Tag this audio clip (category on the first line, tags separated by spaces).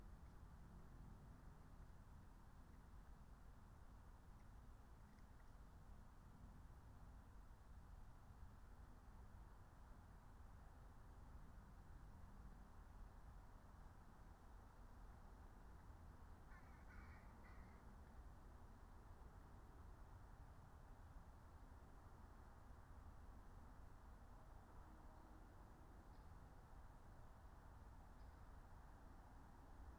Soundscapes > Nature
raspberry-pi phenological-recording nature meadow alice-holt-forest natural-soundscape soundscape field-recording